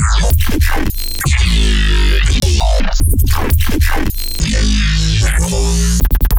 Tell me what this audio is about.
Instrument samples > Synths / Electronic
FILTH RESAMPLE 150BPM
Made and mixed in GarageBand